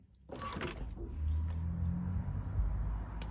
Sound effects > Objects / House appliances
Open window
Opened the window
Click, Open, Window